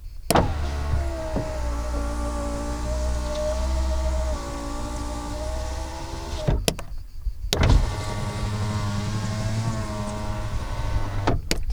Sound effects > Vehicles
Ford 115 T350 - Window up and down
Ford, FR-AV2, T350